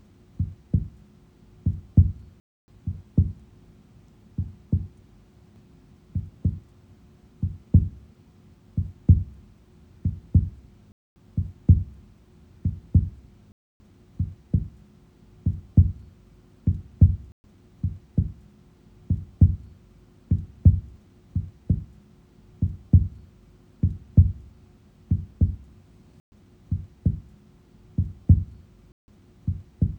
Sound effects > Human sounds and actions
Recorded by knocking on the wall with knuckles.